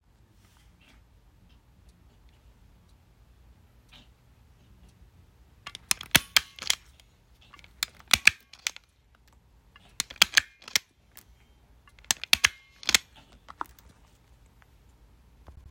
Sound effects > Human sounds and actions
Multiple staplers getting the job done.
office, supplies